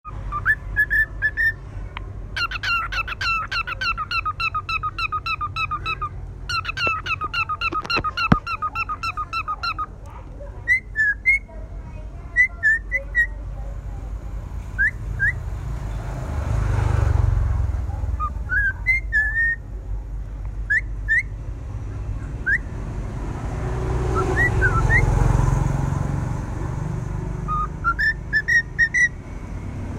Sound effects > Animals
Cậu Chiến Mười's Malaysia parrot.